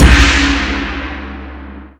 Instrument samples > Percussion
A top-tier orchestral clash cymbal (it can become more realistic attenuated, balance-biased and combined with a different crash you like) for rock/metal/jazz music use. A merger of older low-pitched files (search my crashes folder). tags: pseudogong gong _________ I focus on sounds usable in rock/metal/jazz/pop/electro/etc.